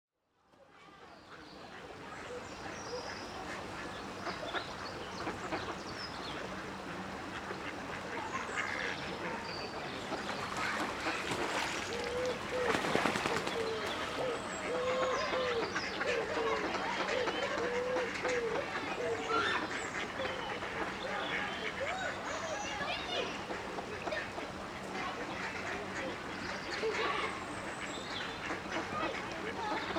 Soundscapes > Nature
A morning recording at Wolseley Nature Reserve, Staffordshire. Zoom H6 Studio, Mono. XY Mics.
human-voices, birds, field-recording, children